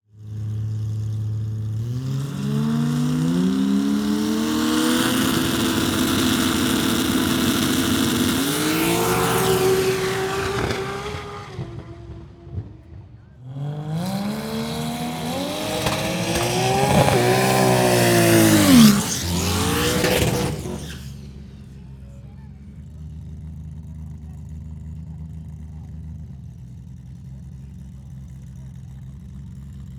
Soundscapes > Other
Wildcards Drag Race AUDIX D6, DPA 4055 Kick-Drum Microphone and Audix TM1 (Measurement Microphone) Portable audio recorder: Sound Devices MixPre-6 II Wildcards Drag Race, which took place on August 17, 2025, in Estonia, on the grounds of the former military airfield in Klitsi. I carried out several tests with different microphones and various setups. This particular clip was made using one specific configuration. Three microphones were used here, and in post-production three different pairs’ runs were combined into a single clip.